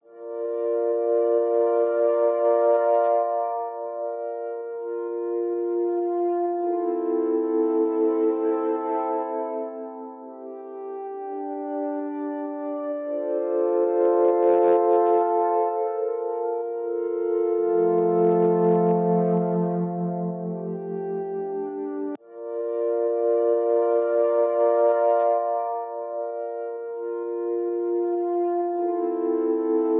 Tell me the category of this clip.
Instrument samples > Synths / Electronic